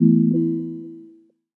Sound effects > Electronic / Design
Basic UI Synth
A delightful lil chime/ringtone, made on a Korg Microkorg S, edited and processed in Pro Tools.
beep, bleep, blip, chirp, click, computer, electronic, game, gui, korg, microkorg, ringtone, sfx, synth, ui